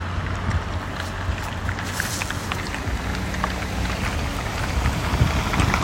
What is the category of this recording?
Sound effects > Vehicles